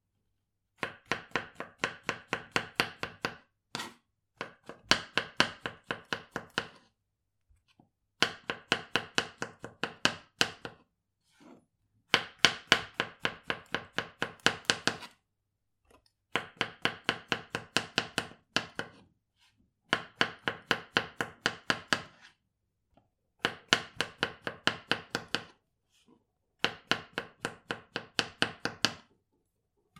Soundscapes > Indoors
Echter Koch schneidet Champignons in feine Scheiben. A real chef cuts mushrooms into thin slices.
cuts, kitchen, slices, cuisine, mushrooms